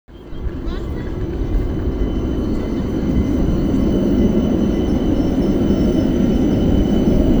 Sound effects > Vehicles
Outdoor recording of a tram at the Helsinki Päärautatieasema tram stop. Captured with a OnePlus 8 Pro using the built‑in microphone.
rail, tram, vehicle